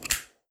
Sound effects > Objects / House appliances
A combination lock locking.